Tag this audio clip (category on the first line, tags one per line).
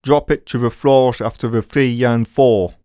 Speech > Solo speech
chant
dry
FR-AV2
hype
Male
Man
Mid-20s
Neumann
oneshot
raw
setence
singletake
Single-take
Tascam
U67
un-edited
Vocal
voice